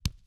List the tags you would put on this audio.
Sound effects > Experimental
punch
bones
thud
vegetable
onion
foley

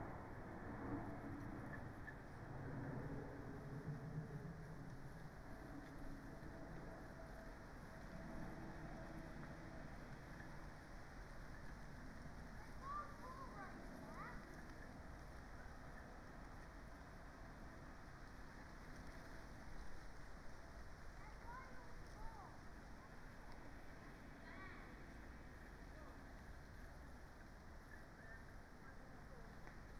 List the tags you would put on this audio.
Soundscapes > Nature
natural-soundscape
artistic-intervention
soundscape
sound-installation
alice-holt-forest
Dendrophone
phenological-recording
raspberry-pi
data-to-sound
modified-soundscape
field-recording
nature

